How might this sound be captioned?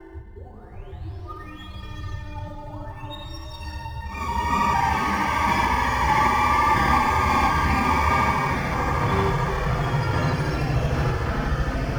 Sound effects > Electronic / Design
Murky Drowning 15
cinematic, content-creator, dark-design, dark-soundscapes, dark-techno, drowning, mystery, noise, noise-ambient, PPG-Wave, sci-fi, scifi, sound-design, vst